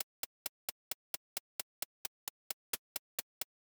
Instrument samples > Percussion
This is one of the hi-hat loops i made
1-shot, hi-hat